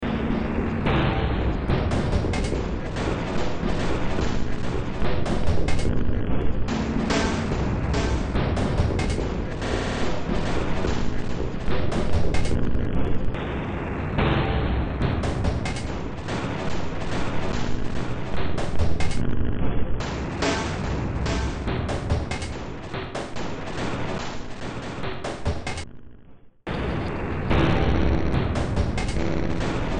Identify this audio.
Music > Multiple instruments
Demo Track #3421 (Industraumatic)
Noise, Sci-fi, Industrial, Soundtrack, Horror, Underground, Games, Cyberpunk, Ambient